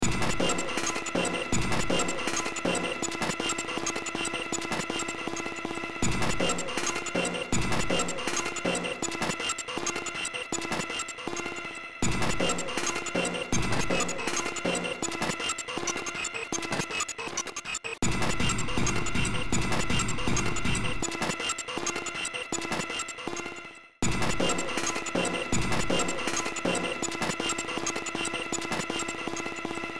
Music > Multiple instruments
Short Track #3974 (Industraumatic)
Cyberpunk, Underground, Games, Industrial, Noise, Soundtrack, Horror, Ambient, Sci-fi